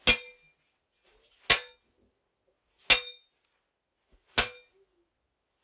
Sound effects > Other
Metal hits (high)
Four metal hits made with a sickle and a metal blade tool. The sound evokes a small dagger or other small weapons, perfect for foley in a fantasy movie or for video games battle. This sound is a high pitch metal version. There is a lower one in the pack, more for sword or heavy weapons. (Unfortunately) recorded in mono with an iPhone (because I had nothing else available), but under ideal conditions. And then processed with RX11 and a few plugin adjustements.
fantasy, clang, sfx, videogame, ring, medieval, shing, metal, knight, axe, sword, hit, knife, foley, weapon, impact, dagger, blade, metallic, game, battle